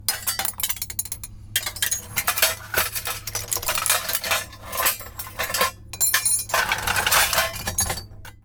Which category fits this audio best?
Sound effects > Objects / House appliances